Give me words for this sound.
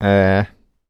Speech > Solo speech
Confused - Ehrr
dialogue, Human, FR-AV2, NPC, U67, Single-take, singletake, voice, Video-game, sound, Mid-20s, Male, Neumann, Vocal, Man, Tascam, talk, Voice-acting, confused, oneshot